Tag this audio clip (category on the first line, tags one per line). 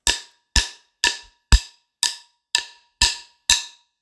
Instrument samples > Percussion
hihats
percussion
drum
samples
drums
hit
loop
drum-loop
acoustic
hh
improvised
groovy
sticks
snare
percs